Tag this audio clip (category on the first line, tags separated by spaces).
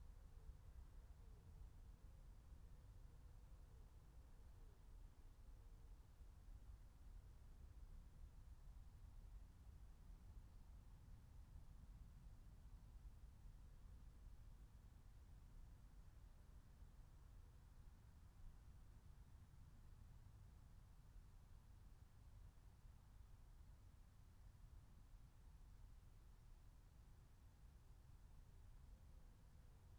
Soundscapes > Nature
field-recording phenological-recording natural-soundscape raspberry-pi nature meadow soundscape alice-holt-forest